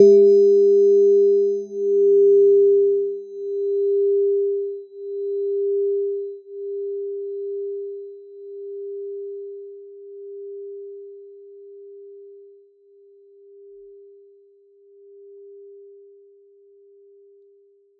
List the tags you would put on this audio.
Sound effects > Objects / House appliances
pipe tone

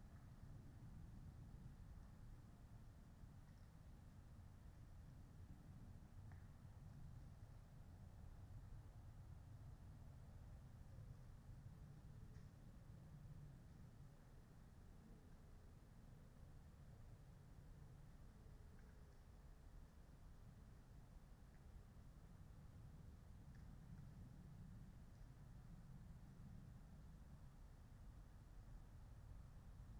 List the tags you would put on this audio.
Soundscapes > Nature
Dendrophone modified-soundscape nature phenological-recording soundscape weather-data

